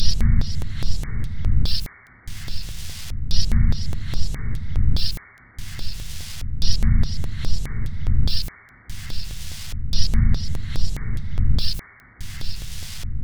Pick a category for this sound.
Instrument samples > Percussion